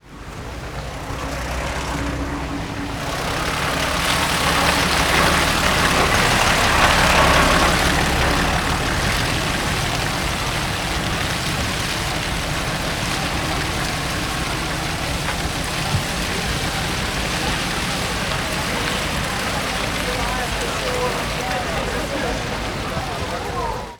Sound effects > Vehicles
VEHTruck-XY Zoom H4e Parking near the admin camp-Pickup truck driving SoAM Piece of Insomnia 2025
Fade In\Out 0.5 sec, Low Shelf about -3Db A Piece of Insomnia 2025 This is a small field recording library capturing a day in the life of volunteers and attendees at one of the world's most renowned international animation festivals. All audio was recorded on a single day—July 20, 2025. Immerse yourself in the atmosphere of a digital detox: experience how the festival's participants unwind far from the urban hustle, amidst vast fields and deep forests, disconnected from the internet and cellular networks. Кусочек «Бессонницы» 2025 Это маленькая шумовая библиотека, состоящая из полевых записей, запечатлевшая один день из жизни волонтёров и посетителей одного из самых известных в мире международных фестивалей анимации. Все аудиодорожки были записаны в один день — 20 июля 2025 года. Погрузитесь в атмосферу цифрового детокса: услышьте, как участники фестиваля отдыхают вдали от городской суеты, среди бескрайних полей и густых лесов, в отрыве от интернета и сотовой связи.